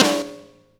Instrument samples > Percussion
snare 6x13 inches DW Edge
• snare: 6×13" (inches) DW Edge • snare microphone (snaremike): Shure SM57 The attack is multiplied 5 times (a good region of it) and the decay has lowered high frequencies.
Noble-Cooley, CC, Pearl, Gretsch, drums, Pork-Pie, PDP, beat, Ludwig, deathsnare, Mapex, Yamaha, death-metal, Craviotto, Premier, mainsnare, snare, Tama, DW, drum, Canopus, AF, Trick, Sonor, percussion, jazz, Spaun, Slingerland, British